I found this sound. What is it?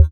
Instrument samples > Synths / Electronic
BUZZBASS 8 Eb
fm-synthesis, additive-synthesis, bass